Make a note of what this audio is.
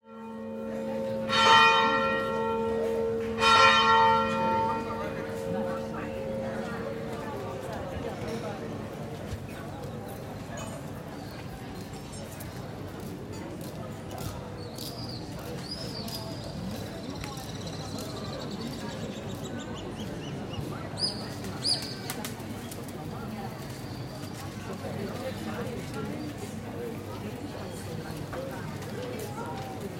Soundscapes > Urban
Corfu - Corfu Town, Church Tolling Bells, People, Birds, Calm
Field-recording made in Corfu on an iPhone SE in the summer of 2025.
tolling, tollingbells, church, corfutown, corfu, bells